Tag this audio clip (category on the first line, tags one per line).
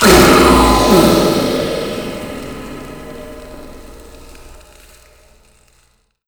Sound effects > Natural elements and explosions
Blue-brand
Blue-Snowball
cartoon
explosion
tremendous